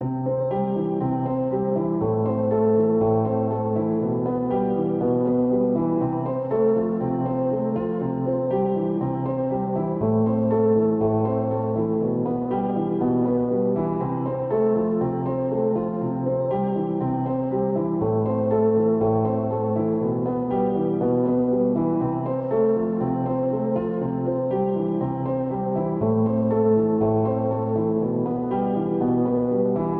Music > Solo instrument
Piano loops 016 efect 4 octave long loop 120 bpm
120, 120bpm, free, loop, music, piano, pianomusic, reverb, samples, simple, simplesamples